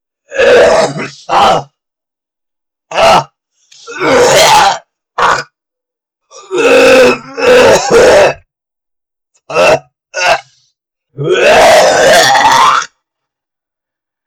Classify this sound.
Sound effects > Human sounds and actions